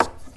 Sound effects > Other mechanisms, engines, machines
Woodshop Foley-026
bam, bang, boom, bop, crackle, foley, fx, knock, little, metal, oneshot, perc, percussion, pop, rustle, sfx, shop, sound, strike, thud, tink, tools, wood